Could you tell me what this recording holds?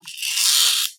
Sound effects > Objects / House appliances
Chains Rattle 3 Texture
Stroking jewellery chains and necklaces in various thicknesses, recorded with an AKG C414 XLII microphone.
Jewellery, Necklace, Chain